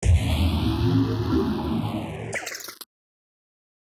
Sound effects > Experimental
destroyed glitchy impact fx -004
abstract, alien, clap, crack, edm, experimental, fx, glitch, glitchy, hiphop, idm, impact, impacts, laser, lazer, otherworldy, perc, percussion, pop, sfx, snap, whizz, zap